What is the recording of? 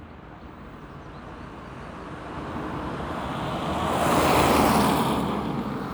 Soundscapes > Urban
voice 3 14-11-2025 car
Car, CarInTampere, vehicle